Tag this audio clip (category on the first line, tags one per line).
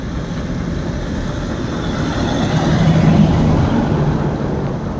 Sound effects > Vehicles
automobile
vehicle